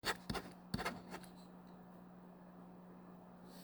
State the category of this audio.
Sound effects > Objects / House appliances